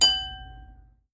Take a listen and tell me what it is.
Sound effects > Objects / House appliances
FOODGware-Samsung Galaxy Smartphone, CU Glass Ding 03 Nicholas Judy TDC
Phone-recording, ding, foley, glass